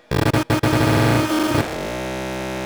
Music > Other
Industrial Estate 11
120bpm, Ableton, chaos, industrial, loop, soundtrack, techno